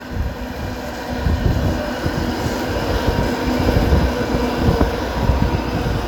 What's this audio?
Sound effects > Vehicles
Recording of a tram (Skoda ForCity Smart Artic X34) near a roundabout in Hervanta, Tampere, Finland. Recorded with a Samsung Galaxy S21.